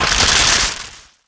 Sound effects > Natural elements and explosions
LoFi FireIgnition-05
Lofi non-explosive ignition sound of a match or gas fire. Foley emulation using wavetable synthesis and noise tables.
activate, burn, burning, candle, crackle, fire, flame, ignite, ignition, match, start, stove